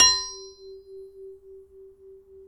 Sound effects > Other mechanisms, engines, machines
metal shop foley -049
tools, bop, knock, little, tink, sfx, fx, pop, bang, crackle, perc, boom, bam, percussion, wood, strike, thud, metal, oneshot, shop, rustle, sound, foley